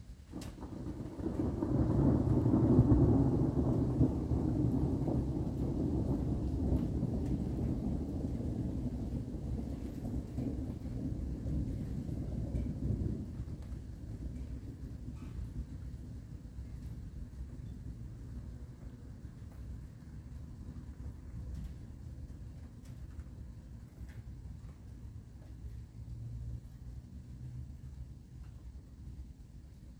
Soundscapes > Nature
A thunderstorm - long, building thunder roll, then distant rumbles.